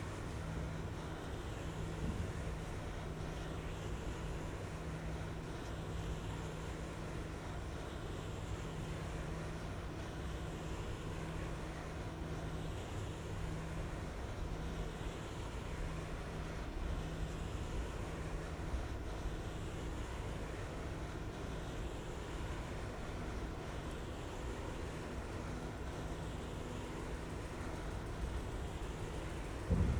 Soundscapes > Urban
The distant, monotonous humming of wind turbines on a cold day, near Vitteaux. Far away a tractor. 2 x EM272 mics